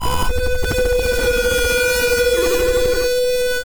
Sound effects > Electronic / Design
Optical Theremin 6 Osc Destroyed-008
Scifi, Synth, Alien, Glitchy, Noise, Spacey, Robot, DIY, FX, Electronic, Bass, Glitch, Optical, Theremin, noisey, Robotic, Experimental, Instrument, Theremins, Electro, Trippy, Handmadeelectronic, Sci-fi, Analog, Otherworldly, Digital, Dub, Sweep, Infiltrator, SFX